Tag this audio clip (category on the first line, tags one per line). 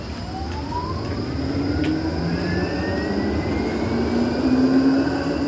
Sound effects > Vehicles
transportation
vehicle
tram